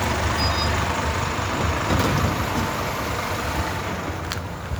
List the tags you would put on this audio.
Sound effects > Vehicles
bus
transportation
vehicle

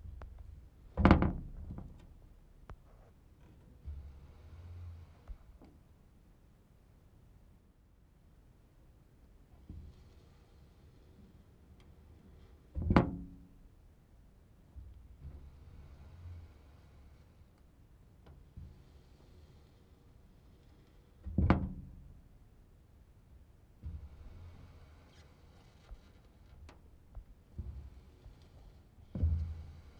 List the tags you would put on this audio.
Sound effects > Objects / House appliances
metal
door
wood

